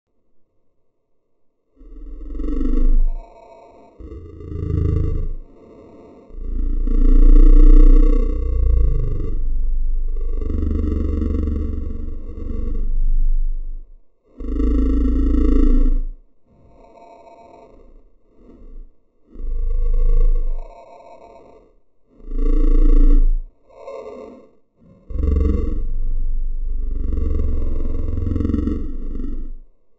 Sound effects > Animals
This just sounds like a dinosaur or something else growling over and over.